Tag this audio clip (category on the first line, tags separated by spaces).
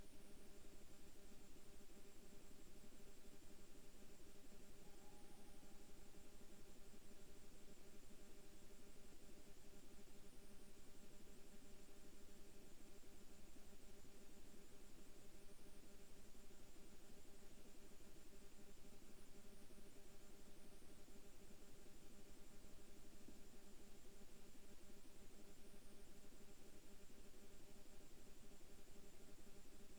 Soundscapes > Nature
phenological-recording data-to-sound natural-soundscape nature modified-soundscape weather-data soundscape artistic-intervention sound-installation alice-holt-forest Dendrophone raspberry-pi field-recording